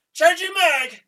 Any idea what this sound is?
Speech > Solo speech
Soldier Changing Mag
Soldier Saying Changing Mag